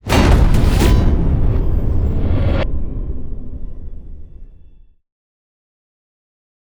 Sound effects > Other
Sound Design Elements Impact SFX PS 052
explosion, blunt, heavy, audio, strike, hit, power, sfx, smash, thudbang, sound, cinematic, collision, crash, force, rumble, shockwave, percussive, effects, impact, sharp, game, transient, design, hard